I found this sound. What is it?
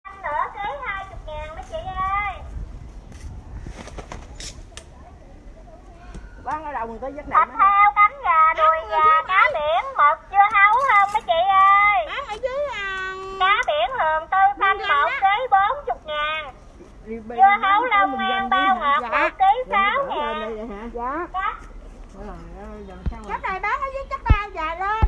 Speech > Solo speech
Thịt Heo, Cánh Gà, Đùi Gà, Cá Linh, Mực, Dưa Hấu Không, Mấy Chị Ơi!
Woman sell stuff. Record use iPhone 7 smart phone 2025.05.08 08:42
sell, business, female, woman, voice